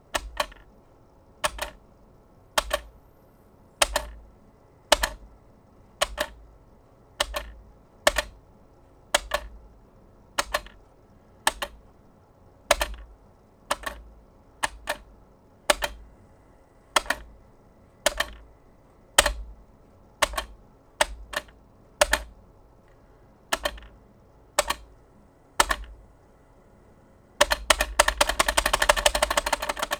Sound effects > Objects / House appliances
MECHClik-Blue Snowball Microphone, CU Button, Rectangular, Press Nicholas Judy TDC
A rectangular button being pressed.
Blue-Snowball, button, Blue-brand, rectangular, press, foley